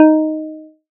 Instrument samples > Synths / Electronic
APLUCK 1 Eb
Synthesized pluck sound
pluck, fm-synthesis, additive-synthesis